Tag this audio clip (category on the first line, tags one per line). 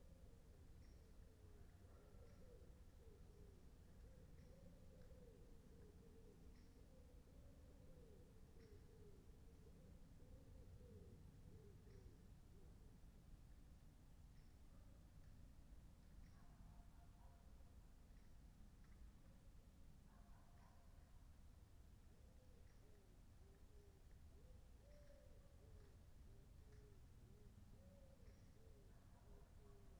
Soundscapes > Nature
alice-holt-forest
raspberry-pi
weather-data
modified-soundscape
Dendrophone
phenological-recording
natural-soundscape
artistic-intervention
soundscape
field-recording
sound-installation
data-to-sound
nature